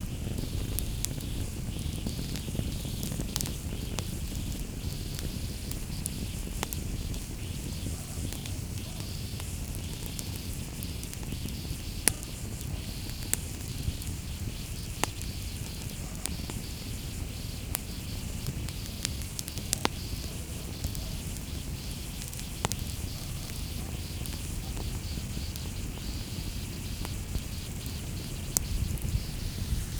Sound effects > Natural elements and explosions
Quema eucalipto Valparaiso
Ambient. Fire burning eucaliptus.